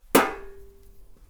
Sound effects > Other mechanisms, engines, machines
Woodshop Foley-077
oneshot, perc, sound, bam, bang, strike, crackle, percussion, wood, little, foley, pop, metal, tink, boom, knock, thud, shop, rustle, bop, fx, sfx, tools